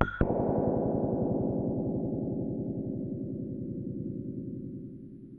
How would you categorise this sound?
Sound effects > Experimental